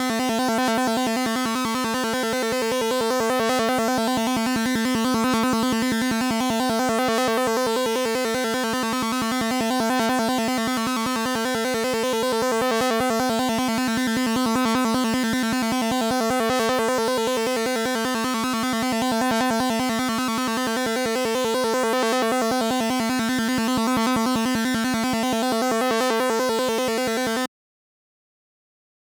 Sound effects > Electronic / Design
Clip sound loops 3
Just easily FM a saw wave with a square wave. Synthsiser just phaseplant.
clip, game, fx, 8-bit